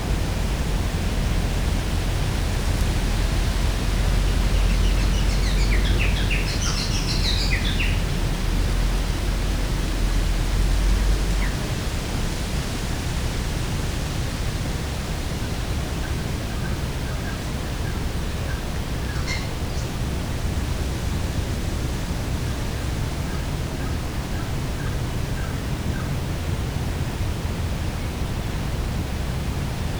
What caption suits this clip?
Soundscapes > Nature
Atmos Australian Bush Single bird call Zoom H1n